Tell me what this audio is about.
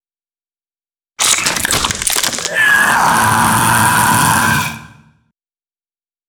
Sound effects > Human sounds and actions
TERRIFYING CREATURE FEEDING
crush, eating, horror